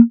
Instrument samples > Synths / Electronic
CAN 8 Bb
additive-synthesis, bass, fm-synthesis